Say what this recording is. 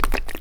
Sound effects > Other mechanisms, engines, machines
shop foley-032
bam, sound, bop, percussion, rustle, thud, metal, strike, fx, pop, tools, foley, knock, crackle, boom, sfx, little, shop, tink, bang, wood, oneshot, perc